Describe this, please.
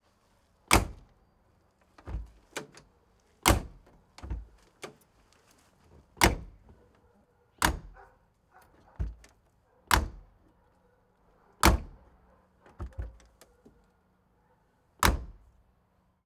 Sound effects > Vehicles
Fiat 600 DOOR
car, door, engine, Fiat, old, vehicle, vintage